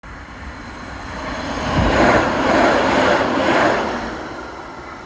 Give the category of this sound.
Soundscapes > Urban